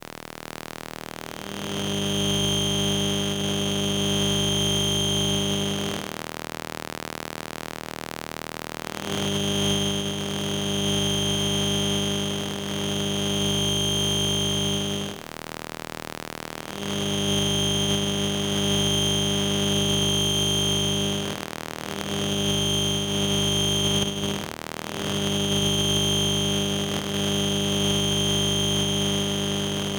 Sound effects > Experimental
SomaEther DoorBell
Some electronic Noises, recorded with a Soma Ether V2, near my Door Bell. Editing: EQ and Compression.
Buzz, Electric, Ether, Glitch, Hum, Noise, Sci-Fi, Soma, Sound-Design, Static, Weird